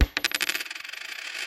Sound effects > Objects / House appliances
OBJCoin-Samsung Galaxy Smartphone Dime, Drop, Spin 01 Nicholas Judy TDC
A dime dropping and spinning.
spin, drop, Phone-recording, foley, dime